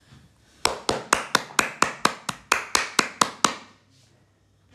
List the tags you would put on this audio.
Sound effects > Human sounds and actions
claps clap clapping

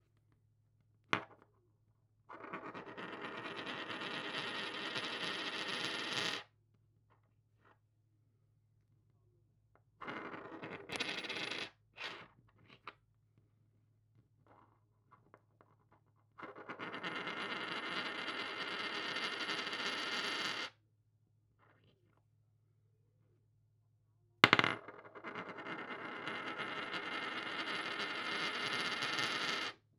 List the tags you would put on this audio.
Sound effects > Objects / House appliances
coin spinning-coin coin-drop coin-toss

